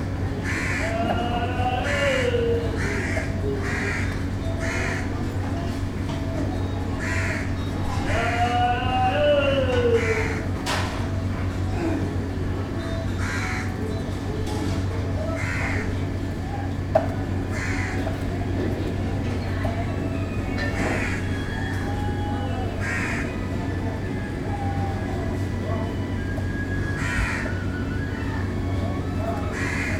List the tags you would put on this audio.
Soundscapes > Urban
Yoga bells Temple Hindu Hinduism

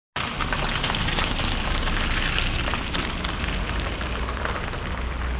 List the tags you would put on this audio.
Sound effects > Vehicles
Car field-recording Tampere

